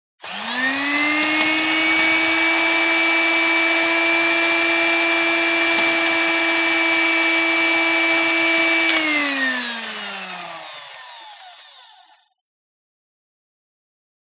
Sound effects > Objects / House appliances
mixer-speed-4
A kitchen mixer running at the speed 4. Recorded with Zoom H6 and SGH-6 Shotgun mic capsule.
mixer, motor, speed